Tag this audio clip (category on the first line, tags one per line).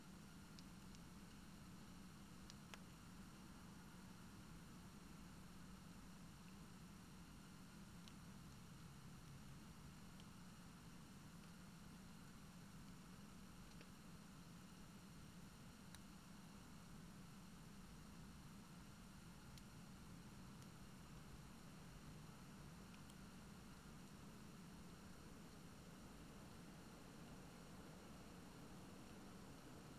Soundscapes > Nature
alice-holt-forest
nature
meadow
soundscape
phenological-recording
field-recording
natural-soundscape
raspberry-pi